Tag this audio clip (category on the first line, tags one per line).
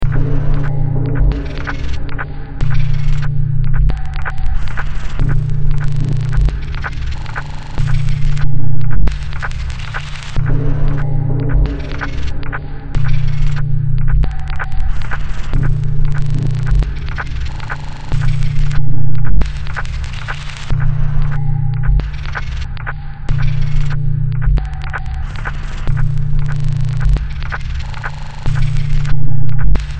Music > Multiple instruments
Games
Horror
Noise
Sci-fi